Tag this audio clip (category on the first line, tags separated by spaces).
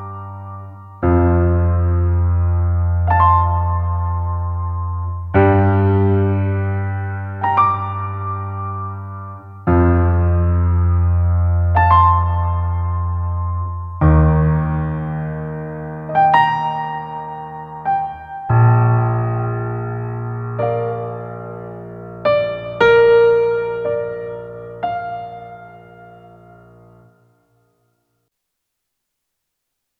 Music > Solo instrument
chords epiano sample Yamaha